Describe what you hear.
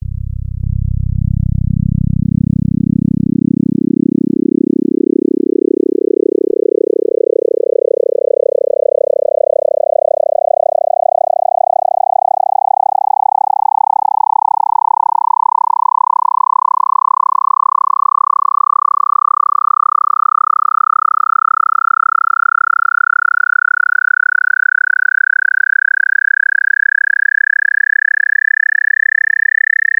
Instrument samples > Synths / Electronic
07. FM-X RES2 SKIRT3 RES0-99 bpm110change C0root
Yamaha FM-X engine waveform
FM-X, MODX, Montage, Yamaha